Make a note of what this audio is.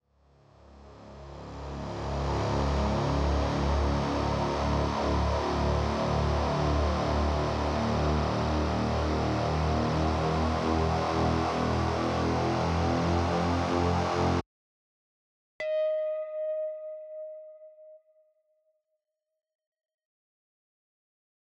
Soundscapes > Synthetic / Artificial
A classic riser using a motor-like synth, gradually ramping up in intensity until suddenly and entirely stopping. After a short silence, a distant bell impact sounds. Originally used for a sort of nightmarish sequence with an abrupt teleport at the end.